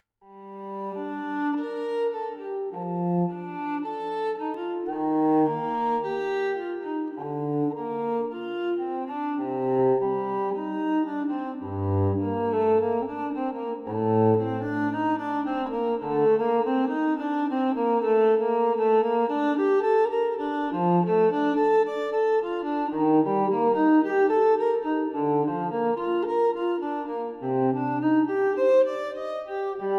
Music > Multiple instruments
Classic music describing the percet exagons geometry built by the bees
exagons, Strings, violins